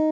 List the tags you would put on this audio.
Instrument samples > String
guitar cheap tone design stratocaster arpeggio sound

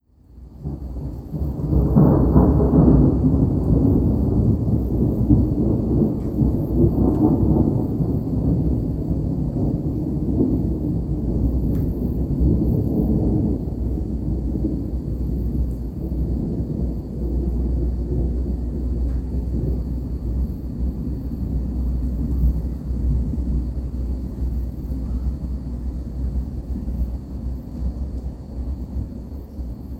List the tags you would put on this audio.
Sound effects > Natural elements and explosions
Phone-recording thunder boom roll crickets background ambience long distant

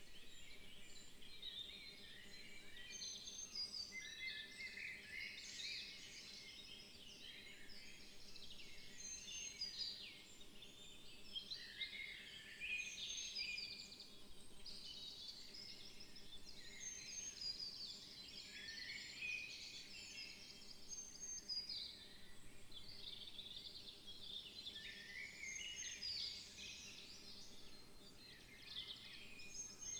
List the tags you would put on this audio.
Soundscapes > Nature
natural-soundscape modified-soundscape sound-installation field-recording Dendrophone raspberry-pi nature soundscape weather-data

